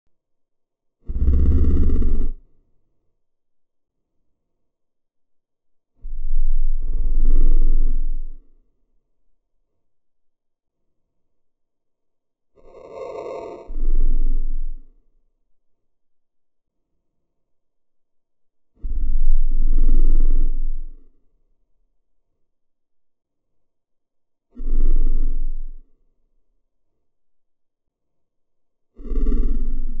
Sound effects > Animals
This just sounds like a dinosaur or something else growling over and over. This could be a sound used in a horror video game to be the sound of some gross, putrid beast, or this could be used for that same reason, but in an adventure game where this is a beast.